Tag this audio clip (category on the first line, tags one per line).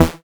Instrument samples > Synths / Electronic
bass
additive-synthesis
fm-synthesis